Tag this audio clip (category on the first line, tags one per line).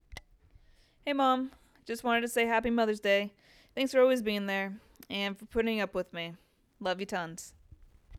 Speech > Solo speech
CasualVoice
EverydayLove
HappyMothersDay
MothersDay
ThanksMom
VoiceOver